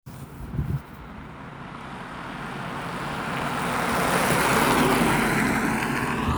Soundscapes > Urban
voice 14-11-2025 4 car
What: Car passing by sound Where: in Hervanta, Tampere on a cloudy day Recording device: samsung s24 ultra Purpose: School project
Car, vehicle